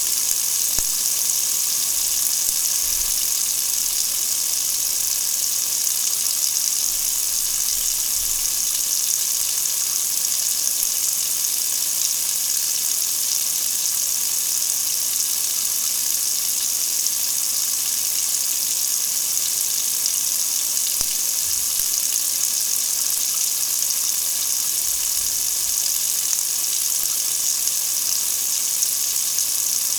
Sound effects > Objects / House appliances
For this upload I placed a Rode M5 microphone (connected to a Zoom H4n multitrack recorder) roughly 18 inches away from a faucet with water running out of it, into a metal kitchen sink. I then used Audacity to normalize the audio and prepare it for sharing with the world.